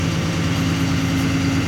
Sound effects > Vehicles
MAN/Solaris bus cruising at low RPM.